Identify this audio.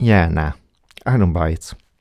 Speech > Solo speech
Doubt - Yeah Nah I dont buy it
dialogue, doubt, FR-AV2, Human, Male, Man, Mid-20s, Neumann, NPC, oneshot, singletake, Single-take, skeptic, skepticism, talk, Tascam, U67, Video-game, Vocal, voice, Voice-acting